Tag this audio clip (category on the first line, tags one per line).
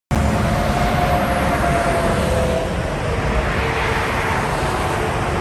Sound effects > Vehicles
road
highway
truck